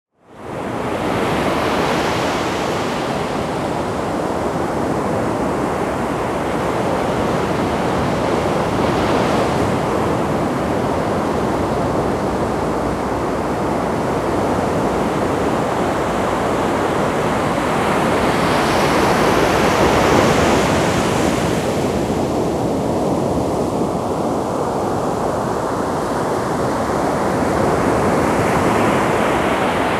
Soundscapes > Nature
Beach Sunrise - Deep Rhythmic Waves Breaking on the Shore
Waves breaking on the shore while the sun greets the day (you have to imagine the last part, but trust me it happened). Recorded with a Zoom H2n in 4 channel surround mode
breaking-waves, seaside, waves, ocean, surf, splash, relaxing, wave